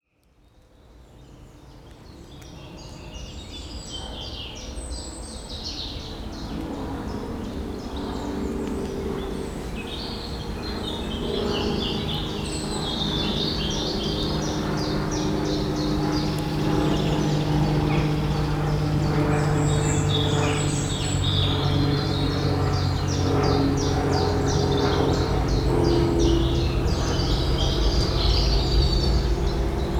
Soundscapes > Nature
A recording at Blithfield Reservoir, Staffordshire. Morning time.
recording, natural, birds, field, reservoir, nature